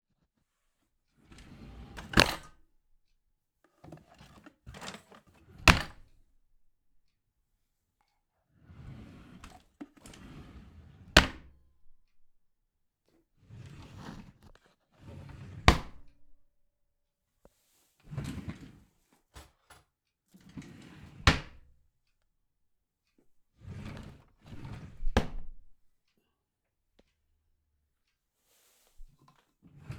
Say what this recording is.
Sound effects > Other mechanisms, engines, machines
DRWRWood Kitchen Draws
wood, draws, sfx, open, closing, kitchen, close, opening